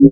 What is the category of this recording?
Instrument samples > Synths / Electronic